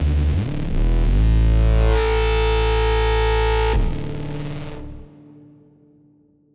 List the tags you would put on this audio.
Music > Solo instrument
digital loopable wobble edm dirty dubstep analog synthy weird sub feedback distortion dubby hard synth nasty dance bassy bass distorted sharp noise fuzz loop

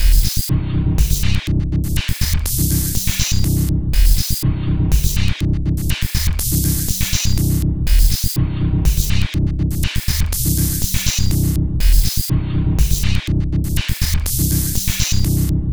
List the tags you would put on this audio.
Instrument samples > Percussion
Soundtrack Alien Drum Ambient Loopable Dark Industrial Underground Loop Samples Packs Weird

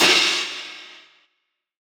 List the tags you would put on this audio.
Instrument samples > Percussion
Meinl UFIP metallic hi-hat clash Zildjian metal flangcrash bang Chinese crunch low-pitched clang crack China Istanbul Sinocymbal sizzle Soultone Stagg sinocrash shimmer ride smash boom Sabian cymbal Paiste

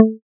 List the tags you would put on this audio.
Instrument samples > Synths / Electronic
pluck fm-synthesis additive-synthesis

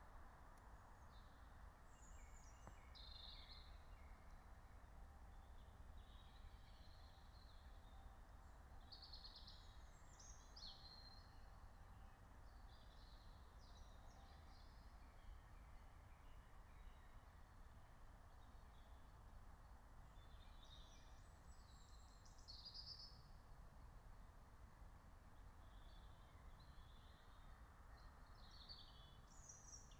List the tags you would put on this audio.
Soundscapes > Nature
meadow phenological-recording raspberry-pi soundscape natural-soundscape nature field-recording alice-holt-forest

